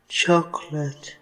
Speech > Solo speech
British Transfem female voice saying "Chocolate", echo added
voice, foods, word, woman, female, words, trans